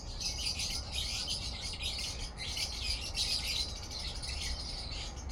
Soundscapes > Nature
Birds Squawking
Several birds chirping at once recorded on my phone microphone the OnePlus 12R
chirping, singing, bird